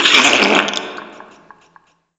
Sound effects > Human sounds and actions
Wet Fart At Church
I was at church today an I had Indian before so you know what's up.